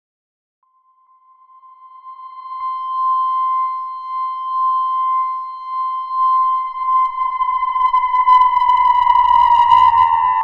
Sound effects > Electronic / Design
PHANTOM FEED
effect, fx, riser, sfx, sound-design, sounddesign, sound-effect, soundeffect, uplift, uplifter